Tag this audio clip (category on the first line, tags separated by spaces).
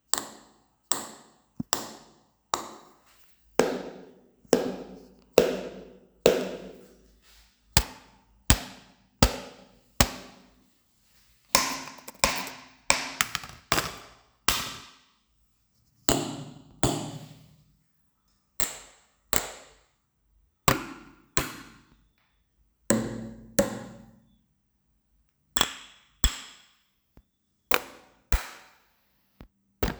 Sound effects > Objects / House appliances
foley drums percussive percussion house hits